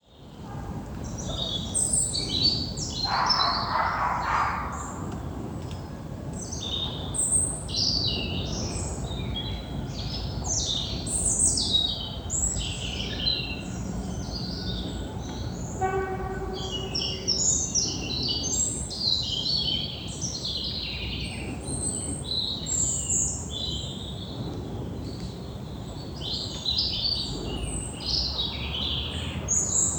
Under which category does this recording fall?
Soundscapes > Nature